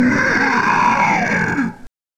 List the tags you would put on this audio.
Sound effects > Experimental
Alien
bite
Creature
demon
devil
dripping
fx
gross
grotesque
growl
howl
Monster
mouth
otherworldly
Sfx
snarl
weird
zombie

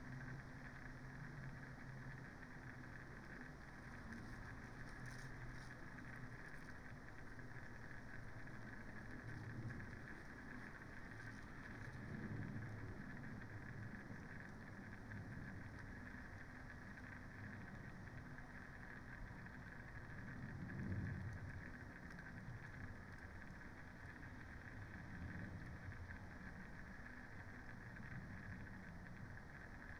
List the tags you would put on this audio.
Soundscapes > Nature
artistic-intervention
field-recording
nature
data-to-sound
sound-installation
alice-holt-forest
natural-soundscape
raspberry-pi
soundscape
phenological-recording
weather-data
Dendrophone
modified-soundscape

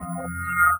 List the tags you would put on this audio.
Sound effects > Electronic / Design
alert
confirmation
digital
interface
message
selection

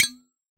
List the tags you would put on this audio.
Sound effects > Objects / House appliances
recording
sampling

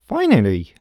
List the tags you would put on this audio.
Speech > Solo speech
oneshot
Releif
NPC
relief
Male
U67
Human
dialogue
Tascam
Neumann
Voice-acting
Vocal
Finaly
voice
Single-take
Man
Mid-20s
talk
singletake
FR-AV2
Video-game

